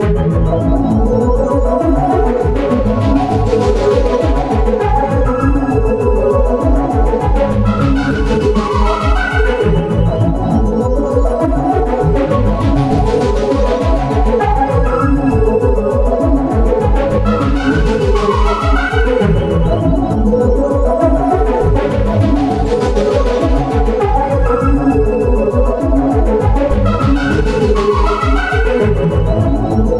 Music > Multiple instruments
ambient beat loop 2 150bpm
Again from the same track i abandoned made in fl studio use for anything